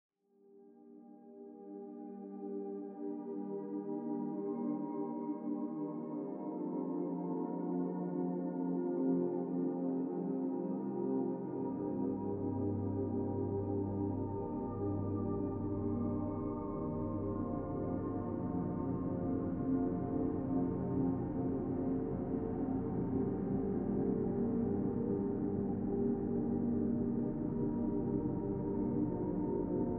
Soundscapes > Synthetic / Artificial
Spacey Ambience 4
Yet more calm and spacey feeling ambience that fills your headphones with a gentle digital atmosphere. Made using a scrapped song of mine